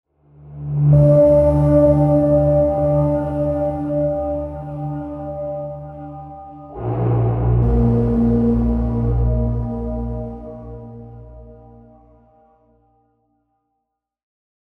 Soundscapes > Synthetic / Artificial
Creepy ambient cue, using distant bell sounds and a reverberating, muffled mix of piano and strings. Originally used to introduce an imposing, otherworldly witchhut, but you'll find more clever uses.